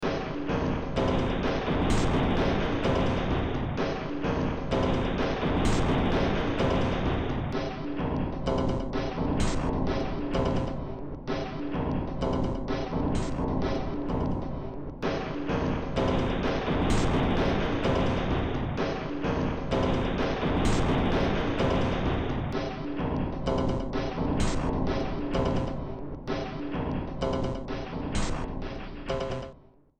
Music > Multiple instruments
Demo Track #3712 (Industraumatic)
Games, Industrial, Underground, Cyberpunk, Horror, Ambient, Noise, Soundtrack, Sci-fi